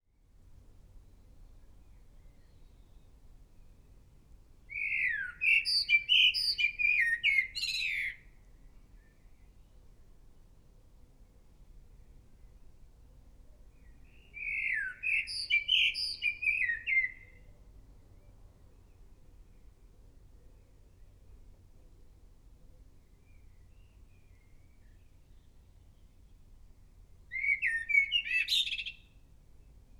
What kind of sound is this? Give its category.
Soundscapes > Nature